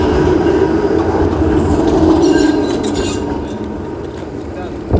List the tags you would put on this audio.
Sound effects > Vehicles
city
field-recording
traffic
tram

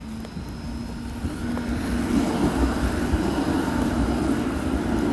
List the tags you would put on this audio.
Sound effects > Vehicles
Tampere
tram
vehicle

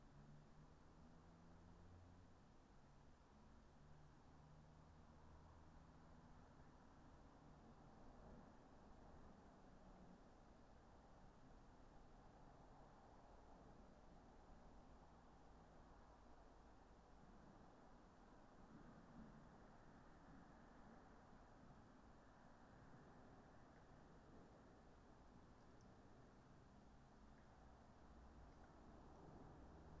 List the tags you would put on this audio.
Soundscapes > Nature
phenological-recording
artistic-intervention
alice-holt-forest
field-recording
nature
data-to-sound
soundscape
Dendrophone
modified-soundscape
weather-data
raspberry-pi
natural-soundscape
sound-installation

